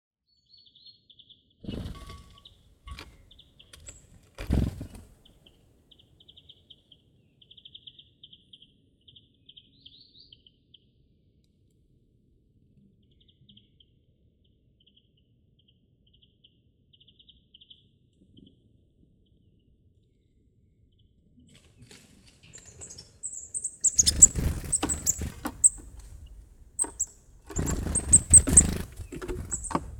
Soundscapes > Nature
BIRDMisc Birdhouse GILLE HOFEFELD ZoomH3VR 2026-01-16 Binaural Denoised
Ambisonics Field Recording converted to Binaural. Information about Microphone and Recording Location in the title.